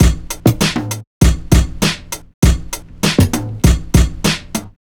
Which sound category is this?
Music > Other